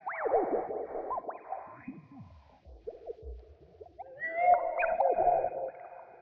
Soundscapes > Synthetic / Artificial
LFO Birsdsong 74
Description on master track
Birsdsong, massive